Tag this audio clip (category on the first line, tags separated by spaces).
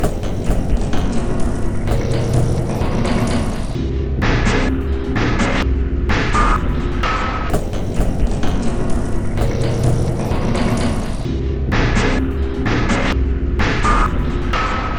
Instrument samples > Percussion
Alien Dark Industrial Loop Loopable Packs Soundtrack Underground Weird